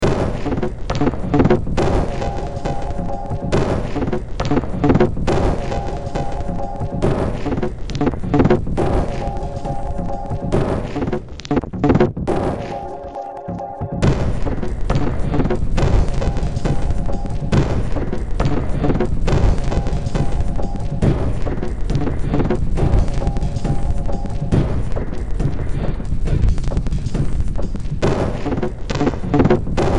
Music > Multiple instruments
Short Track #3324 (Industraumatic)
Cyberpunk, Noise, Games, Underground, Horror, Ambient